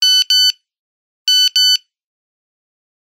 Sound effects > Objects / House appliances
Nokia 6210 SMS Beep Tone
The iconic text/SMS tone of the equally iconic Nokia 6210. Recorded sometime around 2001 with a Sony MiniDisc recorder. This recording has been trimmed and cleaned.